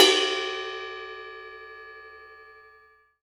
Music > Solo instrument
Cymbal Muted-003
Crash, FX, Drums, Ride, Hat, Cymbals, Oneshot, Paiste, Perc, Metal, Percussion